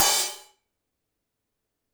Music > Solo percussion
Recording ook a crash cymbal with all variations